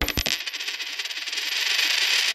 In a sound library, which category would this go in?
Sound effects > Objects / House appliances